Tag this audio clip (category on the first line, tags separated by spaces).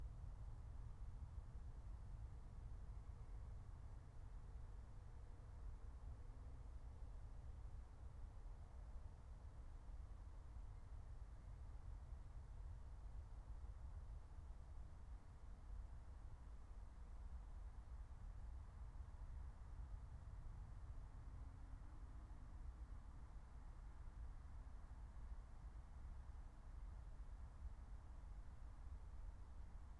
Soundscapes > Nature
field-recording phenological-recording raspberry-pi soundscape alice-holt-forest meadow natural-soundscape nature